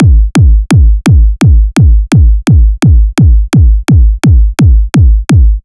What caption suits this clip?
Music > Other
This is a kick made with a Kick3 in G2
Kick, Tekno, Tribe